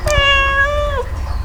Sound effects > Animals
Subject : An adult female cat, kinda fat. Meowing Date YMD : 2025 July 02 Morning Location : Albi 81000 Tarn Occitanie France. Sennheiser MKE600 with stock windcover P48, no filter. Weather : Processing : Trimmed fade in/out in Audacity. Notes : Tips : With the handheld nature of it all. You may want to add a HPF even if only 30-40hz.